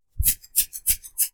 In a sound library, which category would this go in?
Sound effects > Human sounds and actions